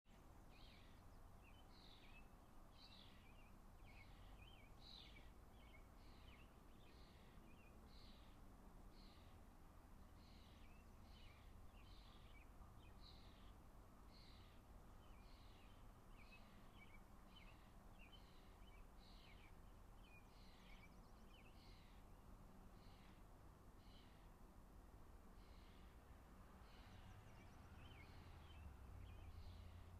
Soundscapes > Nature
Evening birds relaxation 2
Calm birds singing in the late afternoon. Recorded on a iPhone 14 Pro Max with a TX wireless mic
ASMR, birds, calm, relax, Relaxation